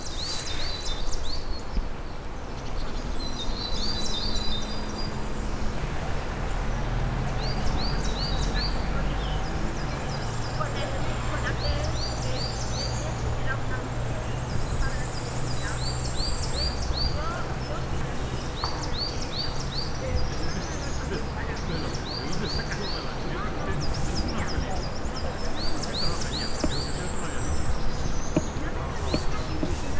Soundscapes > Nature
20250312 Collserola Mallblava People Pleasant
People, Mallblava, Collserola, Pleasant